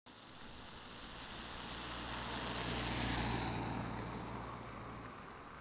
Sound effects > Vehicles
tampere car15
automobile, vehicle